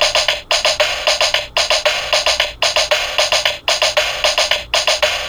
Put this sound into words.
Sound effects > Objects / House appliances
An electronic drum rhythm loop.